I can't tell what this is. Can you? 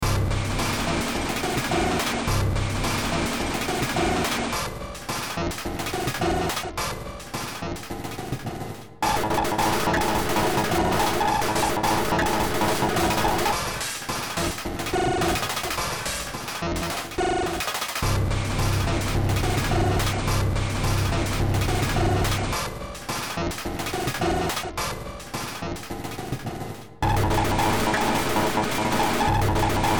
Music > Multiple instruments
Short Track #3824 (Industraumatic)
Ambient, Cyberpunk, Games, Horror, Industrial, Noise, Sci-fi, Soundtrack, Underground